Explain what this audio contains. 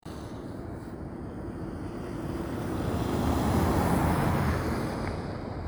Sound effects > Vehicles
A car passing by in Hervanta, Tampere. Recorded with Samsung phone.
car, engine